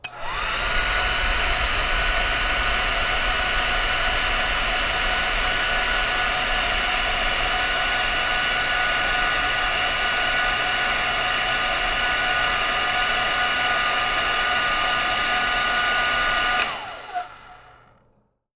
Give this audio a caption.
Sound effects > Objects / House appliances
MACHAppl-Blue Snowball Microphone Hair Dryer, Turn On, Run, Turn Off, High Nicholas Judy TDC
A hair dryer turning on, running at high and turning off.
Blue-brand, Blue-Snowball, hair-dryer, high-speed, run, turn-off, turn-on